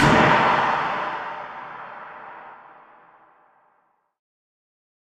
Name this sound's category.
Instrument samples > Percussion